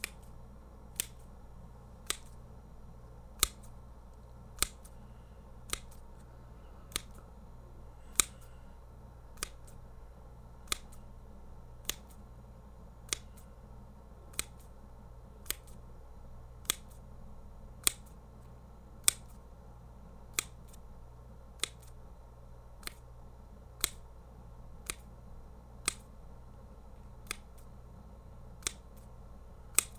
Sound effects > Objects / House appliances
OBJOffc-Blue Snowball Microphone Scissors, Small, Snipping Nicholas Judy TDC
Small scissors snipping.
foley, small, Blue-Snowball, scissors, Blue-brand, snip